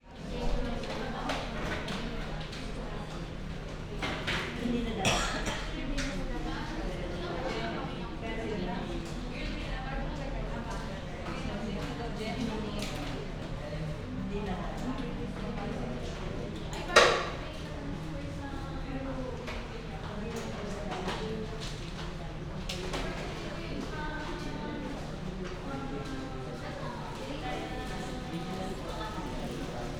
Soundscapes > Indoors
250826 093010 PH Waiting in a Filipino bank Binaural
Waiting in a Filipino bank (binaural, please use headset for 3D effects). Atmosphere while waiting in a bank of Calapan city (Oriental Mindoro, Philippines), with the counters slightly on the left. Recorded in August 2025 with a Zoom H5studio and Immersive Soundscapes EarSight Binaural microphones. Fade in/out and high pass filter at 60Hz -6dB/oct applied in Audacity. (If you want to use this sound as a mono audio file, you may have to delete one channel to avoid phase issues).
soundscape, people, Calapan-city, binaural, bank, women, office, general-noise, indoor, atmosphere, talking, chatting, men, conversation, ambience, work, Philippines, field-recording